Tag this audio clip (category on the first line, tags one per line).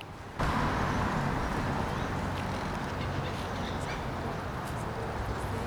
Soundscapes > Nature
Collserola
CotorretaPitgris
Nature